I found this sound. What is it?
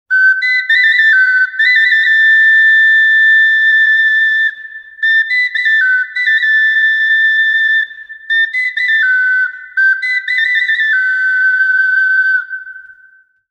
Instrument samples > Wind
Flauta (frase corta 3)
Flauta de barro encontrada en zona arqueológica de México. (Réplica). Clay flute found in an archaeological site in Mexico. (Replica)